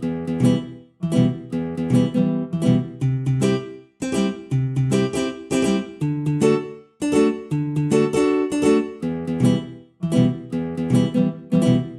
Music > Solo instrument
Shenanigans Chords Em-C-D-Em @ 80bpm
80bpm, acoustic, Progression, music, ChordPlayer, Shenanigans, funky, OneMotion, Chord, guitar